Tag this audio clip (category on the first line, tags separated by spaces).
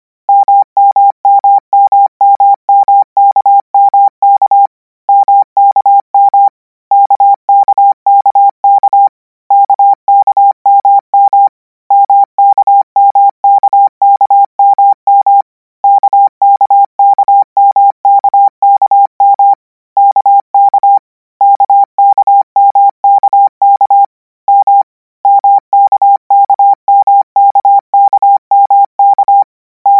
Sound effects > Electronic / Design
characters code codigo morse radio